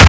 Instrument samples > Percussion
BrazilFunk Kick Punch 1
Used 707 kick From FLstudio original sample pack. Processed with Zl EQ and Waveshaper.